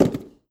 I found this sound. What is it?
Sound effects > Objects / House appliances
OBJPack-Samsung Galaxy Smartphone, CU Box Drop Nicholas Judy TDC
A box dropping.